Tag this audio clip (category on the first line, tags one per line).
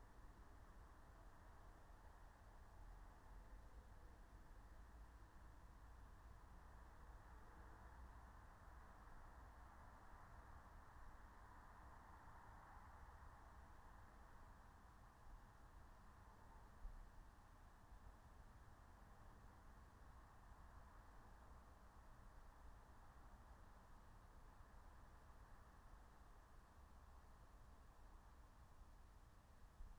Soundscapes > Nature
meadow
raspberry-pi
soundscape
field-recording